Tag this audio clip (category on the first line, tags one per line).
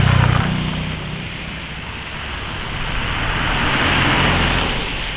Sound effects > Vehicles
field-recording
rainy
automobile
vehicle
car
drive